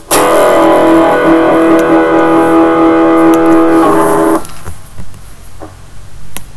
Instrument samples > String
i used my ibanez rg123mh with my tech 21 trademark 30 nyc amp on the british preset.